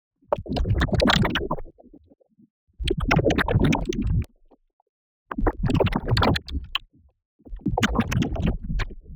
Sound effects > Electronic / Design
FX-Liquid Woosh FX 1-Processed
Botanica, FX, Liquid, Water